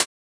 Instrument samples > Synths / Electronic
A hi-hat one-shot made in Surge XT, using FM synthesis.
electronic, surge, synthetic